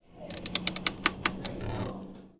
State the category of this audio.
Sound effects > Other mechanisms, engines, machines